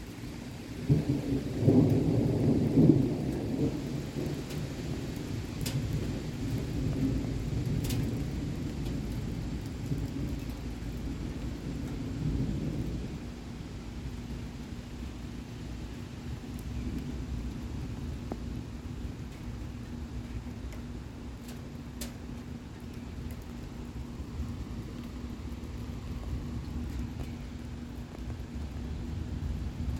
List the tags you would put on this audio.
Soundscapes > Nature
boom crackle heavy lightning Phone-recording rain rumble thunder

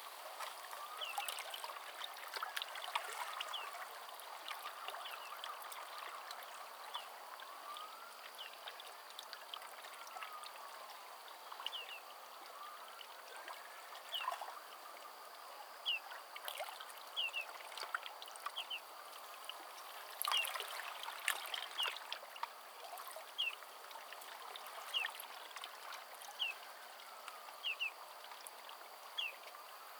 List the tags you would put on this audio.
Soundscapes > Nature
atmosphere ambiance birds ambience field-recording soundscape city siren lake nature truck waves plane water ambient

